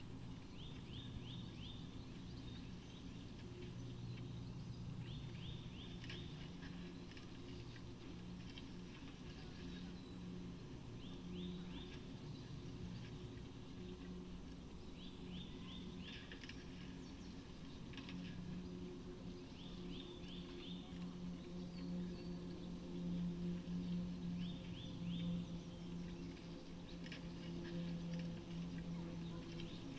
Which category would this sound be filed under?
Soundscapes > Nature